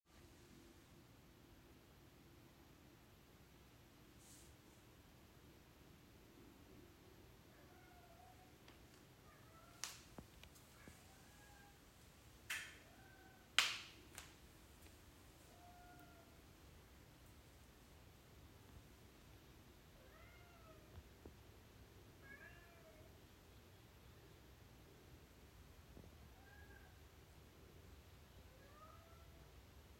Soundscapes > Nature
Whining Ru 07/09/2022
animal, cat, cat-sound, field-recording, kitten, kitty, pet, purr, purring